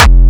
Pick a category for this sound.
Instrument samples > Percussion